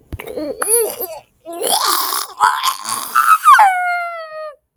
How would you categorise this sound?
Speech > Solo speech